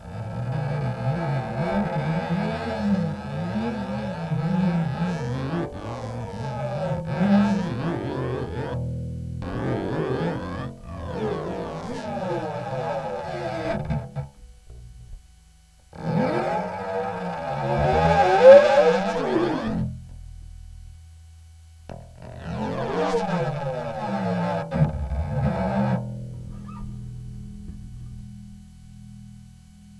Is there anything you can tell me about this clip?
Sound effects > Experimental
Bass string metal rubbing
Recorded for Dare2025-09 part 2 (metal) on the theme of Friction.
Bass-instrument, Dare2025-09, Dare2025-Friction, friction, metal, metal-metal, Metal-on-Metal, metal-to-metal, rubbing, string